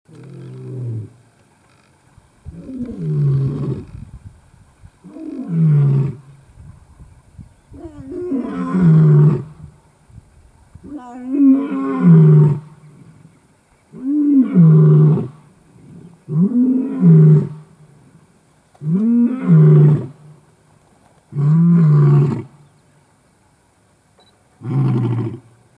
Sound effects > Animals
A very special sound of a male African lion calling for his brother. Recorded at Manyoni game reserve in KZN South Africa. I kept is raw so a little wind noise in the beginning and also can loop the sound.
Lion, Field-recording, calling, Nature